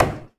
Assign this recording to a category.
Sound effects > Other mechanisms, engines, machines